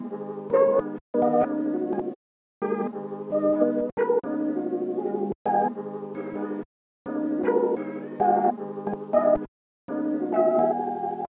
Music > Multiple instruments
Another Loop i made in FL Studio